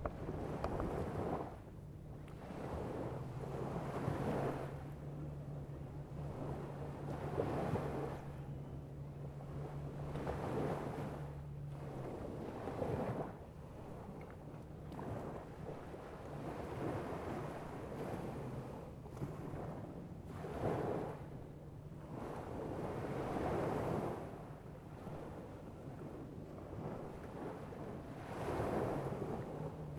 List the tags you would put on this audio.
Soundscapes > Nature
fieldrecording PlayadelCarmen sea

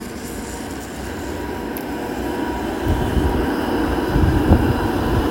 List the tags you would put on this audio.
Sound effects > Vehicles
vehicle Tampere

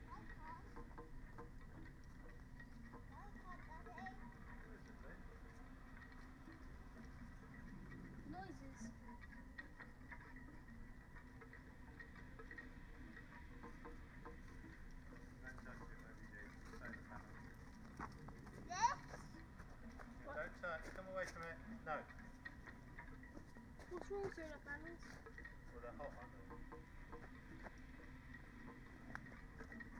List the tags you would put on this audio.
Soundscapes > Nature
modified-soundscape weather-data

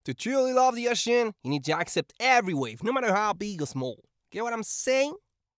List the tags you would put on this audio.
Speech > Solo speech
Surfer August VA FR-AV2 Male oneshot sentence RAW SM57 Adult A2WS

